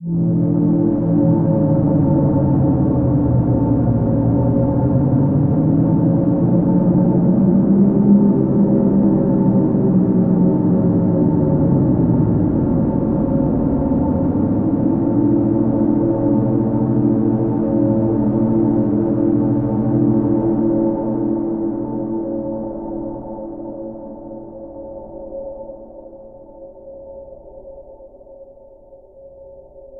Music > Other
dark ambiance (its close, way too close)
short and dark
ambiance, atmosphere, dark, horror, sinister, suspense, terror